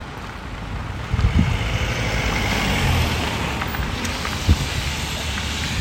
Sound effects > Vehicles
Recording of a car near a roundabout in Hervanta, Tampere, Finland. Recorded with an iPhone 14.
automobile, car, outside, vehicle